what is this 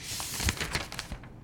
Sound effects > Other
Pages Shuffling
this is an ideal sfx for any book or pages.
book, books, library, page, pages